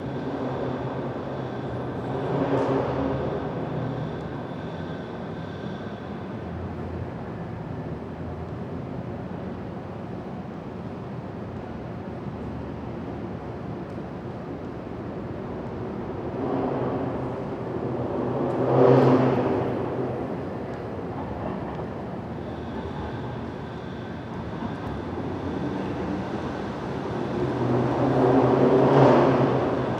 Soundscapes > Urban
Evening on a street in downtown Portland. Various traffic, busses, and trains pass by as well as occasional pedestrians. Overall not a ton of activity, a quiet evening.